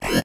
Sound effects > Electronic / Design
RGS-Glitch One Shot 11
Effect, FX, Glitch, Noise, One-shot